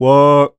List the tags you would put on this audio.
Speech > Solo speech
chant
dry
FR-AV2
hype
Male
Man
Mid-20s
Neumann
oneshot
raw
singletake
Single-take
Tascam
U67
un-edited
Vocal
voice
what
whoot
whot

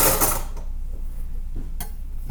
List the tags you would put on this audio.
Sound effects > Objects / House appliances
Beam
Clang
ding
Foley
FX
Klang
Metal
metallic
Perc
SFX
ting
Trippy
Vibrate
Vibration
Wobble

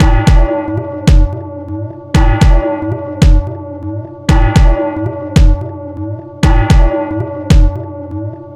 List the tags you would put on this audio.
Music > Other
cinetic
intro
drumloop
loop

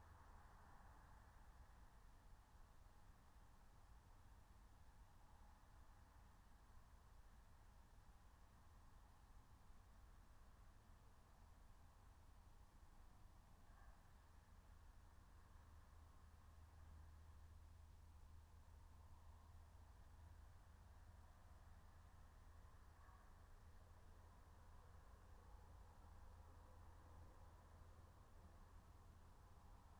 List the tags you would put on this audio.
Soundscapes > Nature
nature
alice-holt-forest
phenological-recording
meadow
natural-soundscape
raspberry-pi
field-recording
soundscape

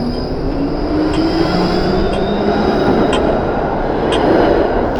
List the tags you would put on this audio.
Sound effects > Vehicles
tramway
transportation
vehicle

